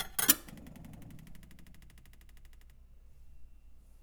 Sound effects > Objects / House appliances
knife and metal beam vibrations clicks dings and sfx-076
Trippy, Perc, ding, Clang, Foley, Vibration, Klang, FX, SFX, Beam, metallic, Metal, ting